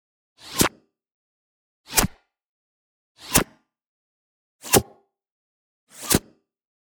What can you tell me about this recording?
Sound effects > Other
Sub Sonic Bullet Body Hit
Body bullet impact sfx, consists of bullet whizing, body hit sound, and a lil fleshy squishy gore thingy to add a lil spice...
Body, Bullet, Hit, Impact